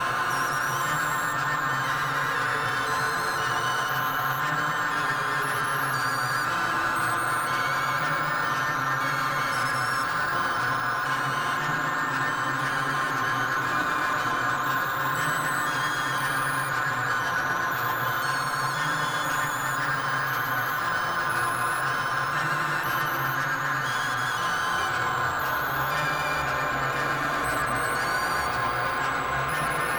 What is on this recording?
Soundscapes > Synthetic / Artificial
Tension-soundtrack4
Experimental retro thriller soundtrack suggestion for movie synthesised using Makenoise Easel and Bruxa with Morphagene and Multimod. Synthback, enjoy and let your hair stand on end!
breathtaking, film-soundtrack, gore, horror, movie, suspense, thriller